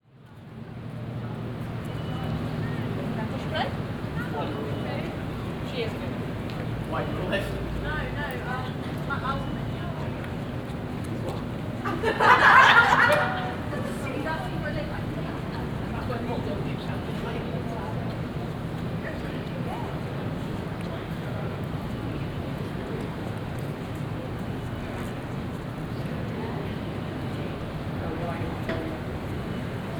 Soundscapes > Urban
Cardiff - 12 - Womanby St 02
cardiff, citycentre